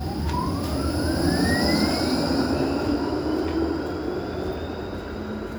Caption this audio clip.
Sound effects > Vehicles
Tram sound in Tampere Hervanta Finland